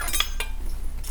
Sound effects > Objects / House appliances
knife and metal beam vibrations clicks dings and sfx-060
Beam, Clang, ding, Foley, FX, Klang, Metal, metallic, Perc, SFX, ting, Trippy, Vibrate, Vibration, Wobble